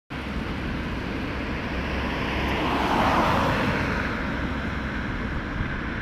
Sound effects > Vehicles
Car 2025-10-27 klo 20.13.00
Field-recording, Car, Finland